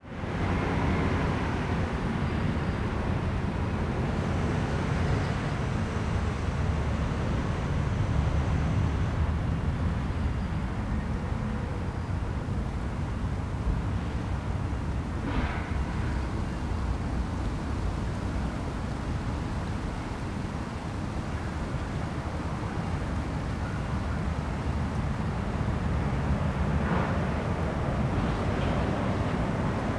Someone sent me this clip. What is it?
Soundscapes > Urban
Splott - Distant Industrical Noise Traffic - Splott Beach Costal Path
splott, wales, fieldrecording